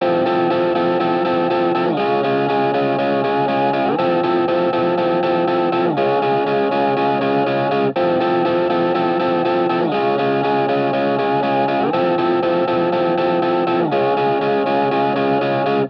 Music > Solo instrument
Guitar loops 124 04 verison 04 120.8 bpm
Otherwise, it is well usable up to 4/4 120.8 bpm.